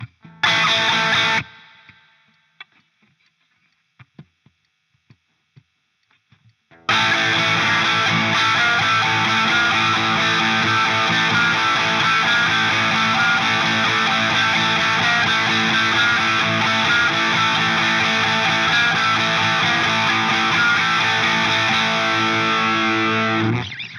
Music > Solo instrument
Guitar riff 130 bpm alternating 4th# and 5th
These are the true sound of a rocker, not machines. Crafted with a real Fender guitar and AmpliTube 5, you're getting genuine, unadulterated guitar energy. Request anything, available to tour or record anywhere!
guitar, rock, metal